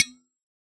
Sound effects > Objects / House appliances
Solid coffee thermos-019
sampling, recording